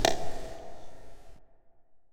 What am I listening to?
Sound effects > Experimental
Creature Monster Alien Vocal FX (part 2)-066
Alien, bite, Creature, demon, devil, dripping, fx, gross, grotesque, growl, howl, Monster, mouth, otherworldly, Sfx, snarl, weird, zombie